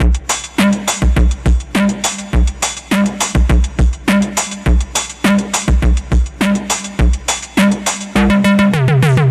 Music > Solo percussion
Sounds made using a Modified TR 606 Drum Machine